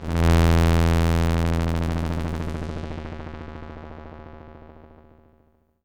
Sound effects > Experimental
Analog Bass, Sweeps, and FX-104
machine, korg, vintage, sci-fi, dark, effect, bassy, robot, sweep, bass, electronic, complex, sfx, sample, analogue, trippy, analog, retro, fx, robotic, snythesizer, synth, alien, pad, weird, oneshot, scifi, electro, basses, mechanical